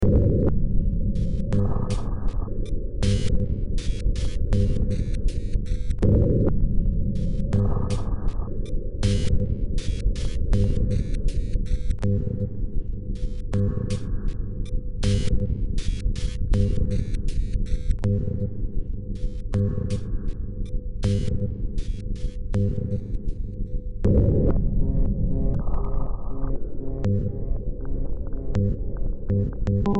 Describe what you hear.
Music > Multiple instruments
Demo Track #3366 (Industraumatic)
Underground, Soundtrack, Cyberpunk, Sci-fi, Horror, Ambient, Industrial, Games, Noise